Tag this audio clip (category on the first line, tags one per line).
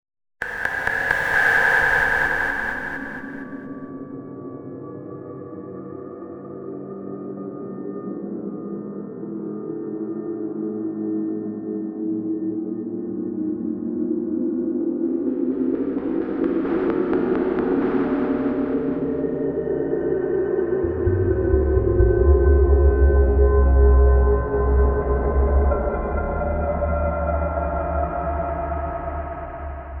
Soundscapes > Synthetic / Artificial
alien
ambience
ambient
atmosphere
bass
bassy
dark
drone
effect
evolving
experimental
fx
glitch
glitchy
howl
landscape
long
low
roar
rumble
sfx
shifting
shimmer
shimmering
slow
synthetic
texture
wind